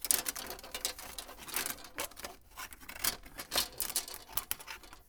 Sound effects > Other mechanisms, engines, machines
metal shop foley -096
bam, bang, boom, bop, crackle, foley, fx, knock, little, metal, oneshot, perc, percussion, pop, rustle, sfx, shop, sound, strike, thud, tink, tools, wood